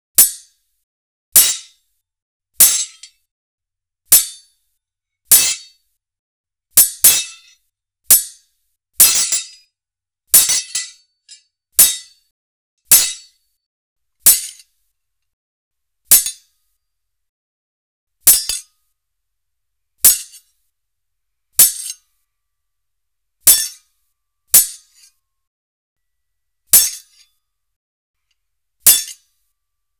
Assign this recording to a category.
Sound effects > Objects / House appliances